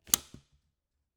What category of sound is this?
Sound effects > Objects / House appliances